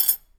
Sound effects > Other mechanisms, engines, machines
shop foley-028
bam, bang, boom, bop, crackle, foley, fx, knock, little, metal, oneshot, perc, percussion, pop, rustle, sfx, shop, sound, strike, thud, tink, tools, wood